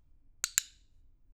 Sound effects > Objects / House appliances
A flashlight noise made from a pet clicker
click, flashlight, pet